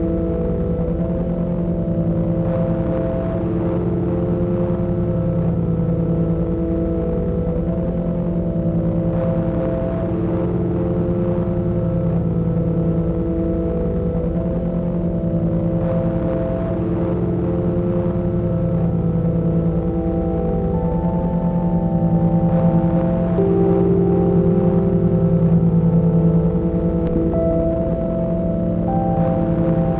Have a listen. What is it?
Music > Other
Shards of all the things that could have been.